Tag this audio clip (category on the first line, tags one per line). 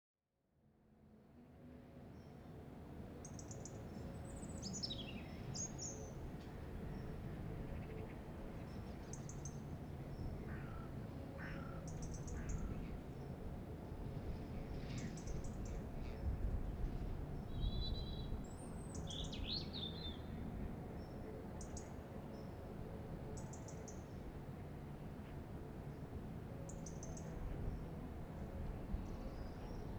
Soundscapes > Urban
ambience
birds